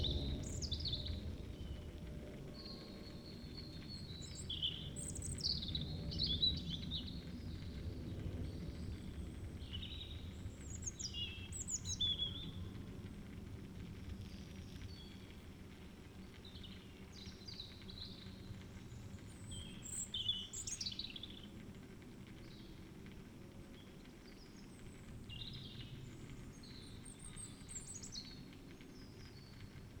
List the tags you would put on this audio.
Soundscapes > Nature
alice-holt-forest
artistic-intervention
data-to-sound
Dendrophone
field-recording
natural-soundscape
nature
phenological-recording
raspberry-pi
sound-installation
soundscape
weather-data

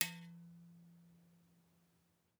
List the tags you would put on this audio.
Sound effects > Other mechanisms, engines, machines
boing
sample

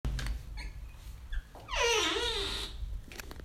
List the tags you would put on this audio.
Sound effects > Objects / House appliances
puerta
wooden
door
creak

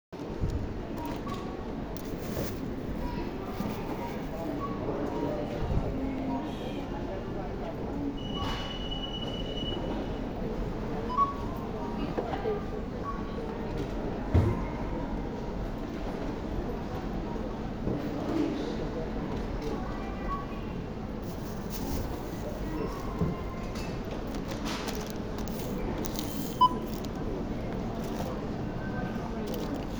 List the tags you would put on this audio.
Soundscapes > Indoors
atmophere
field

